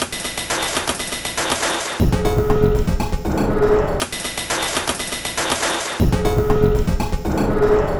Instrument samples > Percussion
This 240bpm Drum Loop is good for composing Industrial/Electronic/Ambient songs or using as soundtrack to a sci-fi/suspense/horror indie game or short film.
Dark, Drum, Loop, Weird, Underground, Ambient, Soundtrack